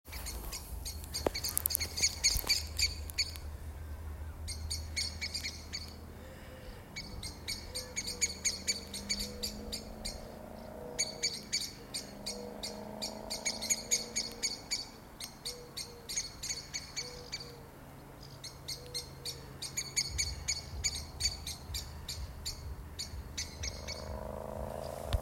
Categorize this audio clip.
Sound effects > Animals